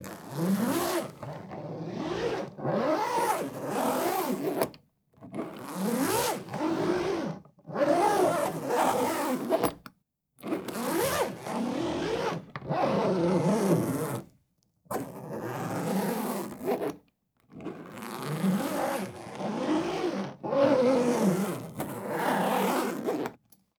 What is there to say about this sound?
Sound effects > Objects / House appliances
A recording of a medium suitcase zip being opened and closed. Fast and slow. Edited in RX11.
close, closing, opening, suitcase, unzip, zip, zipper